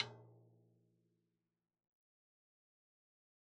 Music > Solo percussion
Med-low Tom - Oneshot 32 12 inch Sonor Force 3007 Maple Rack
tomdrum, loop, perc, kit, drumkit, wood, beat, oneshot, roll, flam, drum, maple, Medium-Tom, Tom, real, percussion, quality, toms, acoustic, recording, drums, realdrum, med-tom